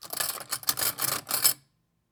Sound effects > Objects / House appliances
forks handling1
foley, cutlery, silverware, forks, kitchen